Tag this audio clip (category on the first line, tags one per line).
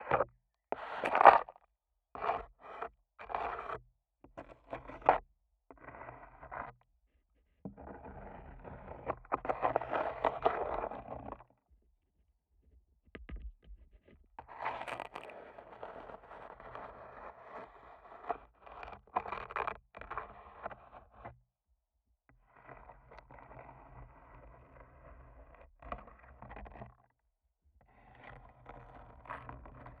Sound effects > Other
contact
fingers
mic
scratching
slow